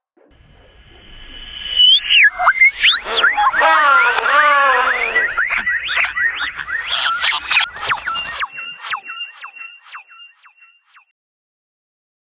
Sound effects > Experimental
Alien Animalia -003
a collection of trippy alien animal sounds from processed animal recordings
abstract, alien, animal, bird, experimental, fx, glitch, growl, grunt, harmonic, insect, otherworldly, rawr, roar, sfx, trippy, tweet